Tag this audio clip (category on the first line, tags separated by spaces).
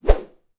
Sound effects > Natural elements and explosions
whosh fast SFX tascam NT5 Woosh Rode swinging FR-AV2 whoosh oneshot one-shot stick Swing Transition